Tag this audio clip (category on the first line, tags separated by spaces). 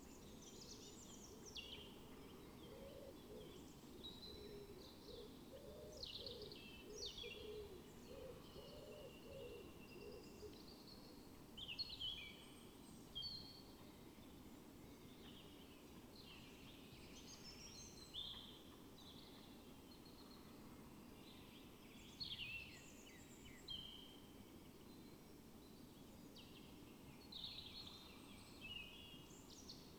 Soundscapes > Nature
weather-data modified-soundscape alice-holt-forest phenological-recording sound-installation nature natural-soundscape artistic-intervention field-recording raspberry-pi data-to-sound soundscape Dendrophone